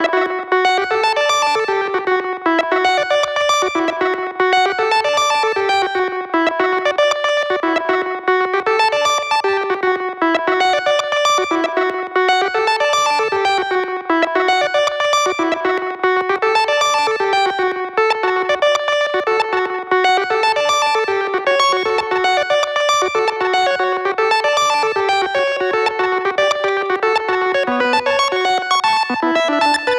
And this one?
Instrument samples > Synths / Electronic
cool wet dream sounds design made in Ableton Live